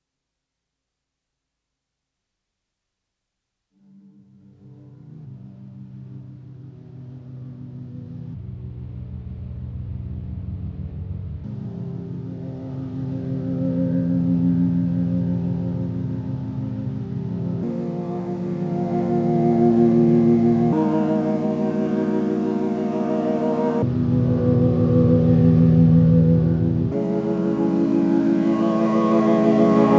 Music > Solo instrument
Liminal Synth
A sample of a rainy day proceed through Ableton to resonate at C3 and then pitched randomly. Inspired by liminal/ analogue horror.
Drone, Horror, Liminal